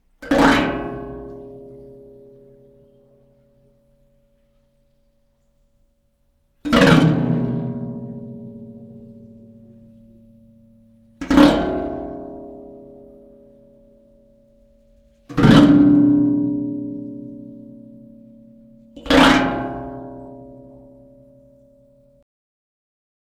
Sound effects > Other mechanisms, engines, machines
METLTonl Gas Heater Grill
Strumming the exhaust grill on a domestic gas hot water system with my hands. Recorded with a Zoom H6e
gas, grill, hotwater, sfx, strumming